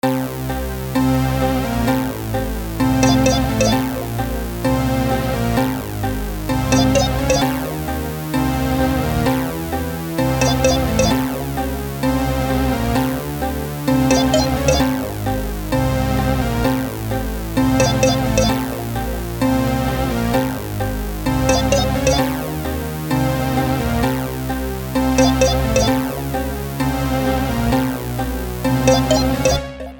Music > Multiple instruments
Electronic music - Krzyk dłoni
cinema, dark, film, game, horror, intro, movie, music, synthwave, trailer